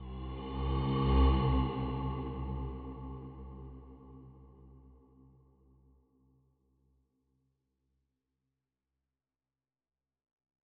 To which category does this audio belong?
Sound effects > Other